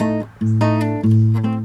Music > Solo instrument
Acoustic Guitar Oneshot Slice 68
twang, oneshot, fx, foley, notes, strings, note, string